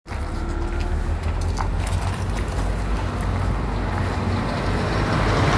Soundscapes > Urban
Bus leaving 27
Where: Hervanta keskus What: Sound of a bus leaving bus stop Where: At a bus stop in the evening in a calm weather Method: Iphone 15 pro max voice recorder Purpose: Binary classification of sounds in an audio clip
traffic, bus, bus-stop